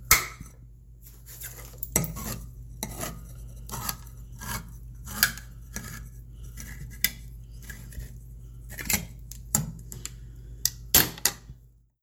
Sound effects > Objects / House appliances
OBJHsehld-Samsung Galaxy Smartphone, CU Can Opener, Manuel, Opening Can Nicholas Judy TDC
Opening a can with a manual can opener.
can, can-opener, foley, manual, open, Phone-recording